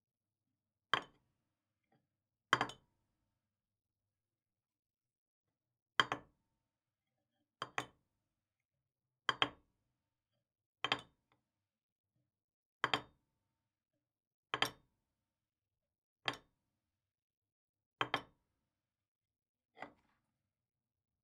Sound effects > Objects / House appliances
Sound of Ceramic Dishes
Here is the sound of a ceramic bowl put on a kitchen counter.
bowl, ceramics, dishes, ceramic, plate, bowls, plates, porcelain, clank, kitchen